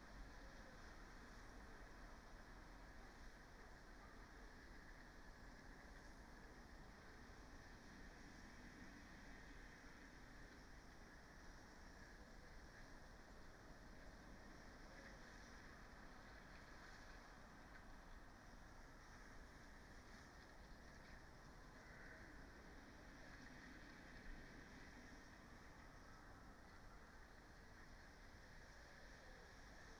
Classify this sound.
Soundscapes > Nature